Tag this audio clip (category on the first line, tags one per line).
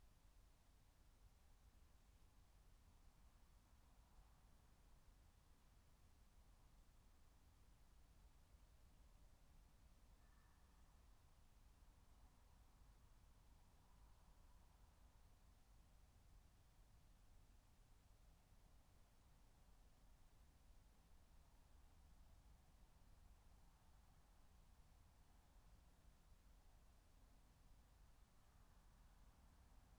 Soundscapes > Nature
meadow
alice-holt-forest
natural-soundscape
nature
soundscape
raspberry-pi
phenological-recording
field-recording